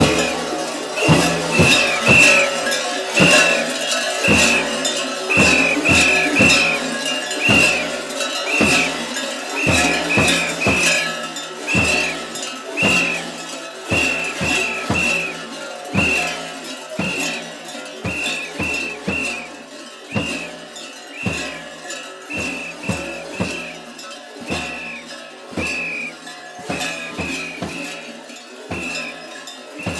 Soundscapes > Other
surva dancing woman talking
Stereo field recording from the famous Surva folk festival in Pernik, Bulgaria. Captures the rhythmic sounds of Survakari (masked dancers) performing a traditional Bulgarian horo dance. The recording features jingling bells, footsteps, crowd ambience, and the deep, resonant beat of the tupan (a large traditional bass drum). A vibrant and energetic example of Bulgaria’s intangible cultural heritage. A woman talking in the end.
traditional, pernik, tupans, bulgarian, dance, bells, folk, horo